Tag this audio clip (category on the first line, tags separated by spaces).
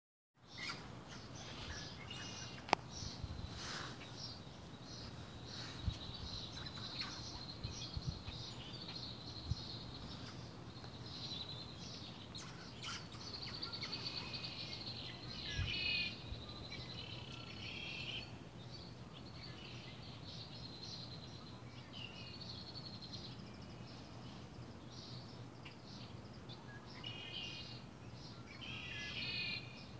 Soundscapes > Nature
nature field-recording park outdoors spring birds